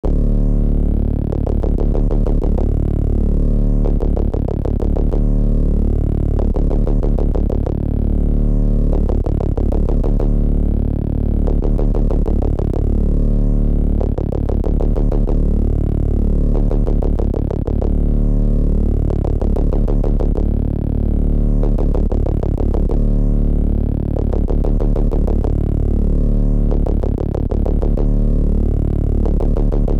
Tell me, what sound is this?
Music > Multiple instruments
Ableton Live. VST Surge,Purity....Bass 94 bpm Free Music Slap House Dance EDM Loop Electro Clap Drums Kick Drum Snare Bass Dance Club Psytrance Drumroll Trance Sample .
94, Bass, Snare